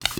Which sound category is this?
Sound effects > Objects / House appliances